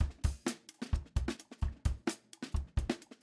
Music > Solo percussion
Short pitched loop 130 BPM in 7 over 8
studio, kit, recording, live